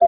Soundscapes > Synthetic / Artificial
LFO Birdsong 59
Birdsong, LFO